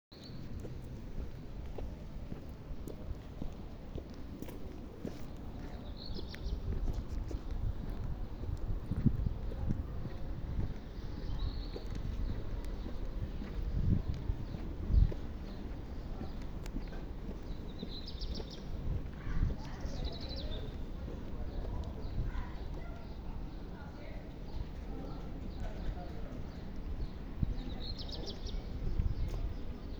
Soundscapes > Nature
20250513 0938 walk and birds phone microphone
walk and birds